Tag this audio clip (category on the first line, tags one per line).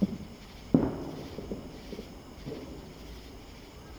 Sound effects > Other
america
fireworks-samples
free-samples
patriotic
United-States